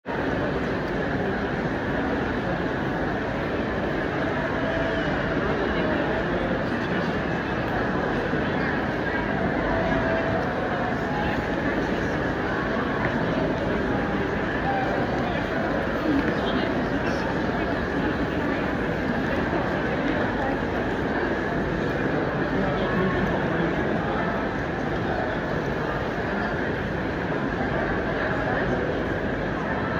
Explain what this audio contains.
Soundscapes > Indoors
Walla people Dutch intern shopping mall Hoog Catharijne 2 2026-01 HZA
iPhone 6 stereo recording of walla of Dutch crowd in a large shopping mall (Hoog Catharijne) in Utrecht, the Netherlands.